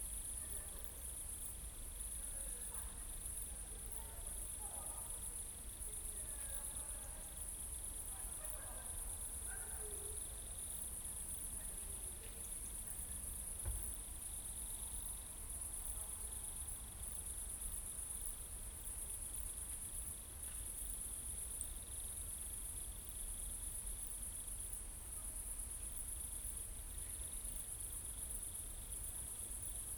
Soundscapes > Nature
20250906 20h10 Gergueil NW entrance (Pt 1 out of 2)- DJI3
Subject : Ambience recording at the NW entrance of Gregueil, during the early Brame season. Date YMD : 2025 September 06 around 20h10 Location : Gergueil 21410 Bourgogne-Franche-Comte Côte-d'Or France Hardware : Dji Mic 3 internal recording. Weather : Processing : Trimmed and normalised in Audacity.